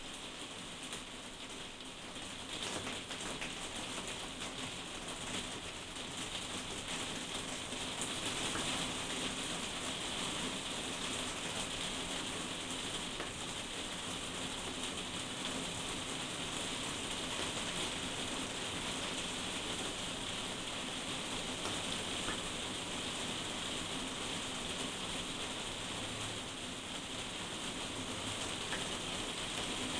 Soundscapes > Indoors
lluvia en el patio
Sonido de la lluvia cayendo sobre un patio techado. Grabado con Tascam DR-05X / Sound of rain falling on a covered patio, recorded with Tascam DR-05X